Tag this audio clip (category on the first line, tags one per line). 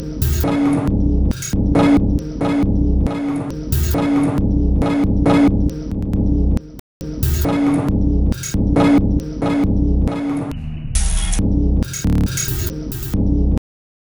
Instrument samples > Percussion
Ambient
Dark
Drum
Industrial
Loopable
Samples
Soundtrack